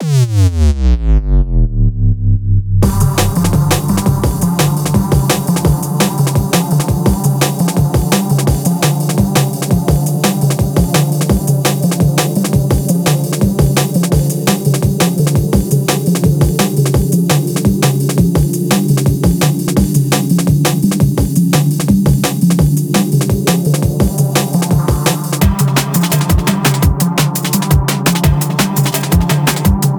Music > Multiple instruments
Jungalist Drum and bass
Junglist drum and bass jam with chopped broken beats, off-time percussion, and a sampled style midi sequenced bass, shaped by automated filters and modulation for movement and mood. First synth is Omnisphere Seismic Shock “Blaze of Glory,” with the master filter slowly pulling down to build a subversive underground feel; a slightly late percussive layer from “Afterparty March” complements it, supported by “Bass Charmer” on the same MIDI. Arrangement set at 85bpm inhale time so the groove feels like 170bpm in half-time and stays danceable. The track nods to 90s DJ culture with a lighter breakdown that keeps the beat rolling, drops intensity, then lifts excitement when the main line returns.
heavy, drum, dance, 170bpm, Jungle, beats, DNB, bass, electronic